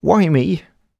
Speech > Solo speech
Sadness - why mee
dialogue, FR-AV2, Human, Male, Man, me, Mid-20s, Neumann, NPC, oneshot, sad, Sadness, singletake, Single-take, talk, Tascam, U67, Video-game, Vocal, voice, Voice-acting